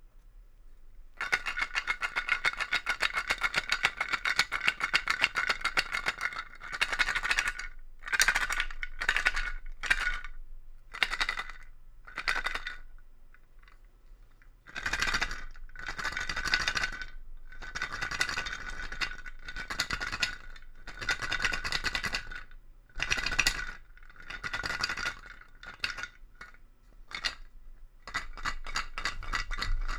Sound effects > Other
I recorded this recently because I wanted some kind of sound that'd be similar to candies being shaken about in a gumball machine. And I had a bunch of pencil cap erasors and a half-plastic half-glass (I think?) box. Might want to see if I can do the same with some actual gumballs, though. Recorded in Audacity on Linux with a RODE NT1, going through a Behringer U-Phoria UM2. No post-processing was done.